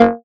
Instrument samples > Synths / Electronic
TAXXONLEAD 4 Bb
additive-synthesis, fm-synthesis, bass